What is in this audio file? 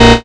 Instrument samples > Synths / Electronic
DRILLBASS 4 Bb
additive-synthesis, bass, fm-synthesis